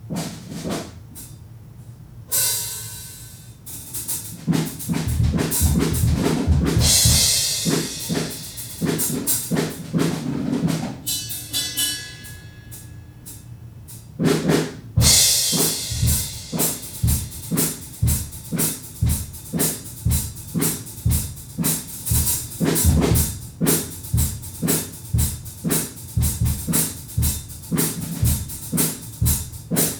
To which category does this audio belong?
Music > Solo percussion